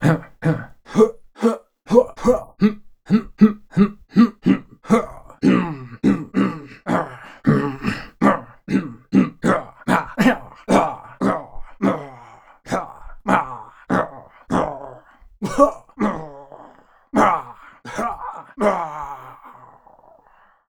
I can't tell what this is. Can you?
Sound effects > Human sounds and actions
voice grunts
Recording of myself making typical "arena shooter" grunting sounds, mildly processed, mono only.
groan, grunt, hurt, moan, ouch, ow, pain